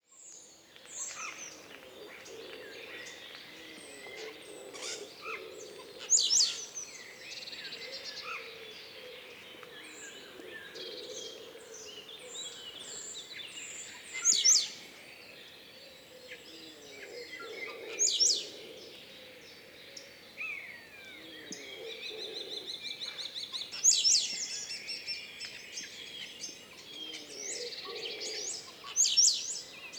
Soundscapes > Nature
Ambient soundscape of a Polish forest. Recorded in Poland, this track features various birdsong and natural background sounds typical for a forest environment. No human noise or mechanical sounds. Effects recorded from the field.